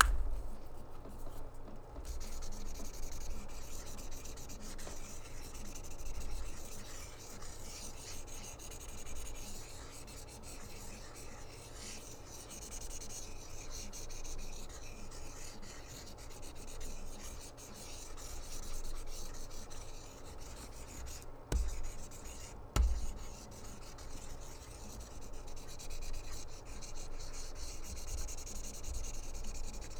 Sound effects > Objects / House appliances
A Crayola colored marker popping open it's top, writing and closing it's top.